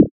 Instrument samples > Synths / Electronic
BWOW 8 Db
bass, additive-synthesis